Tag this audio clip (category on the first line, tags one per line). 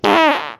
Sound effects > Other
fart
flatulence
gas